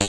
Sound effects > Electronic / Design
RGS-Glitch One Shot 22
Processed with ZL EQ and Waveshaper.